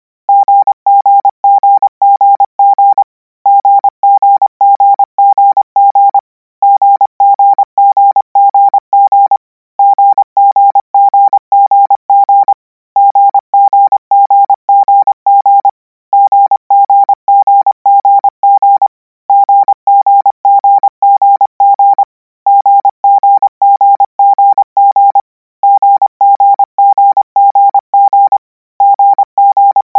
Sound effects > Electronic / Design
Koch 20 V - 200 N 25WPM 800Hz 90
Practice hear letter 'V' use Koch method (practice each letter, symbol, letter separate than combine), 200 word random length, 25 word/minute, 800 Hz, 90% volume.
code, codigo, letters, morse